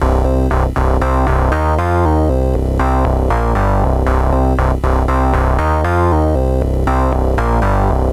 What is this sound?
Music > Solo instrument
Synth/bass loops made with Roland MC-202 analog synth (1983)
Bass, SynthBass